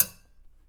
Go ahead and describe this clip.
Sound effects > Objects / House appliances
knife and metal beam vibrations clicks dings and sfx-125
Beam, Clang, ding, Foley, FX, Klang, Metal, metallic, Perc, SFX, ting, Trippy, Vibrate, Vibration, Wobble